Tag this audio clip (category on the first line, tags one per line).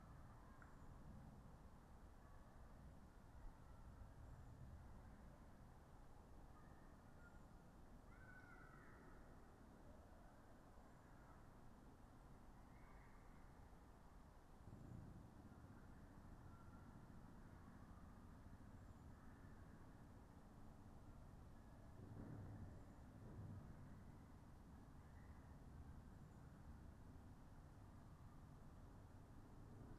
Soundscapes > Nature
soundscape
Dendrophone
data-to-sound
phenological-recording
raspberry-pi
artistic-intervention
field-recording
weather-data
natural-soundscape
sound-installation
alice-holt-forest
modified-soundscape
nature